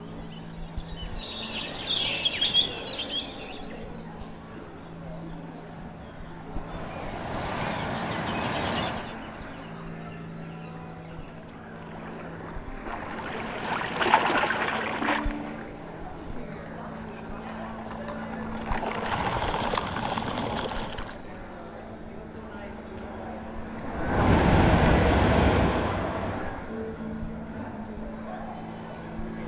Soundscapes > Nature
Birds, Waves, Piano, Water, Voices - Biennale Exhibition Venice 2025
A mix of birds, waves, piano, water and voices. Very nice sound, rich and unexpected (to me). Sound recorded while visiting Biennale Exhibition in Venice in 2025 Audio Recorder: Zoom H1essential
ambience, biennale, bird, birds, exhibition, field-recording, nature, people, piano, talking, venice, voices, water, waves